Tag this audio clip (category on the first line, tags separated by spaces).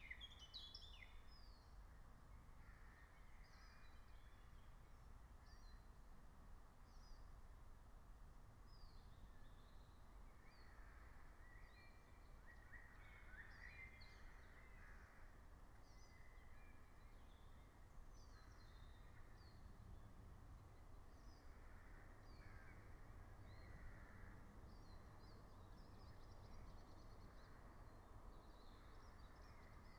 Soundscapes > Nature
nature natural-soundscape alice-holt-forest field-recording raspberry-pi meadow soundscape phenological-recording